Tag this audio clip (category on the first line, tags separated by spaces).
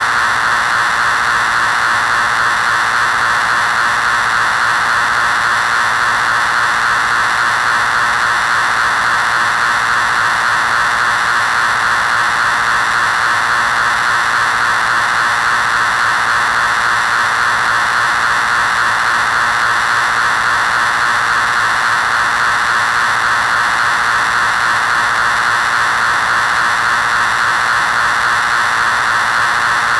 Sound effects > Other mechanisms, engines, machines
IDM Industry Working Machinery